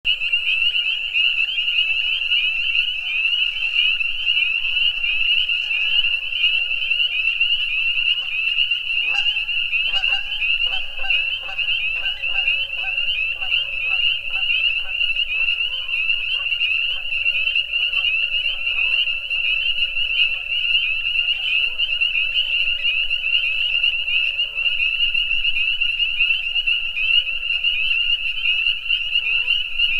Sound effects > Animals
Jungle Frogs 01
Frogs in a jungle
jungle, croaking